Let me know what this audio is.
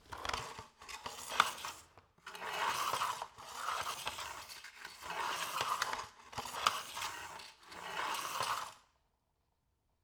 Sound effects > Objects / House appliances
250726 - Vacuum cleaner - Philips PowerPro 7000 series - head on floor (silent vaccuming) (head brush up) 2
Subject : A sound from my pack of my brand spanking new Philips PowerPro 7000 series vacuum cleaner. Date YMD : 2025 July 26 Location : Albi 81000 Tarn Occitanie France. Sennheiser MKE600 with P48, no filter. Weather : Processing : Trimmed and normalised in Audacity.
Vacum, Shotgun-mic, cleaner, MKE-600, 7000, MKE600, vacuum, Single-mic-mono, aspirateur, FR-AV2, Powerpro-7000-series, Tascam, Sennheiser, Shotgun-microphone, Hypercardioid, Powerpro, vacuum-cleaner